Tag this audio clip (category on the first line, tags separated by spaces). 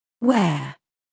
Speech > Solo speech
english pronunciation voice word